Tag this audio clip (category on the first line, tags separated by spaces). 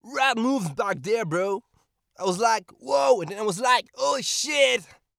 Speech > Solo speech
2025 20s A2WS Adult August Cardioid Dude English-language France FR-AV2 In-vehicle Male mid-20s Mono RAW Single-mic-mono SM57 Surfer Tascam VA Voice-acting